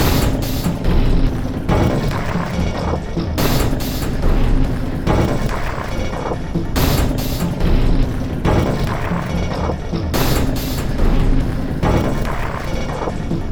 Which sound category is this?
Instrument samples > Percussion